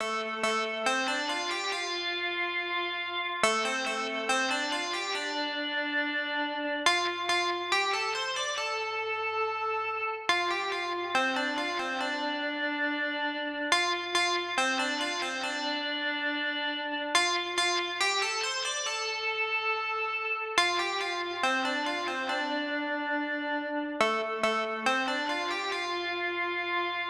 Music > Solo instrument
This loop contains simple beats: half beat, silence and prolonged notes, as well as pitch changes and repetitions. This sound has been created using LMMS's Triple Oscillator plugin preset: PowerString sequenced in 4/4 time signature, at 140 BPM.